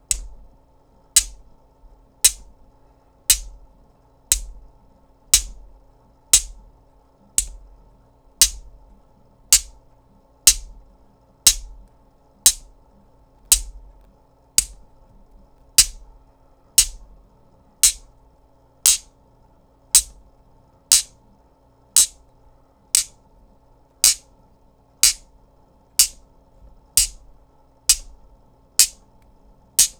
Sound effects > Objects / House appliances
TOYMisc-Blue Snowball Microphone, CU Buzzing Noisemaker Magnets, Clack, Click Nicholas Judy TDC
Buzzing noisemaker magnets clacking or clicking together.
buzzing-noisemaker-magnets, Blue-brand, together, click, clack, Blue-Snowball